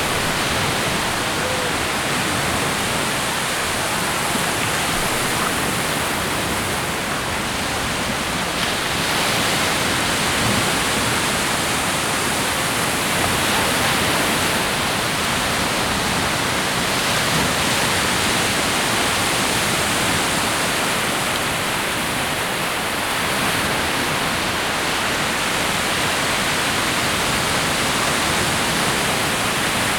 Soundscapes > Urban
WATRSurf-Gulf of Mexico Outgoing Tide from West Beach Pass into Gulf, rushing water, nearby fishermen, surf 10PM QCF Gulf Shores Alabama
Outgoing Tide from West Beach Pass into the Gulf of Mexico, summer, night. Rushing water, nearby fishermen
ambience, beach, fisherman, gulf-coast, nighttime, surf, water, waves